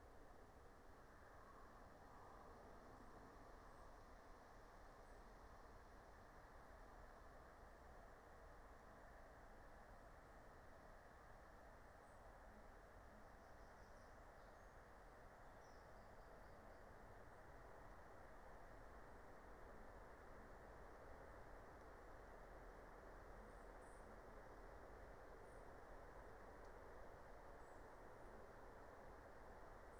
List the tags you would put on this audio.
Soundscapes > Nature
soundscape
alice-holt-forest
natural-soundscape
phenological-recording
meadow
raspberry-pi
nature

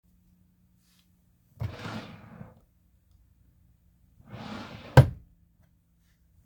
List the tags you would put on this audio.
Sound effects > Objects / House appliances
bedroom
cabinet
close
cupboard
kitchen
open
wood

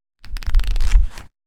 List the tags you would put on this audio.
Sound effects > Objects / House appliances
reading
book
turn
pages

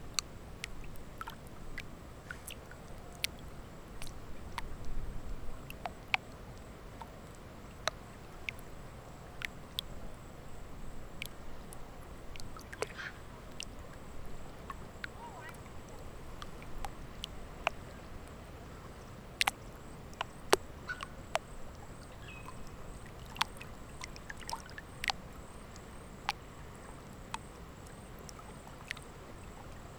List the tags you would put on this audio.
Soundscapes > Nature
Dam
Manly
Atmos
Lapping
Water
Australia
Lake

